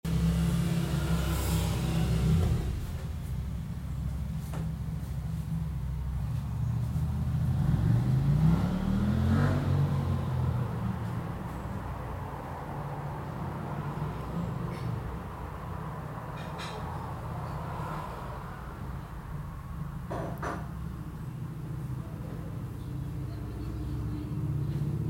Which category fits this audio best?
Soundscapes > Indoors